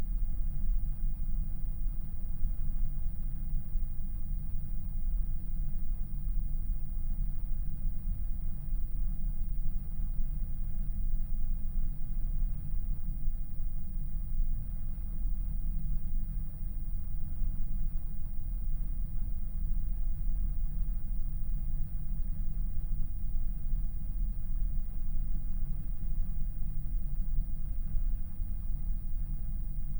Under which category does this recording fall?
Soundscapes > Other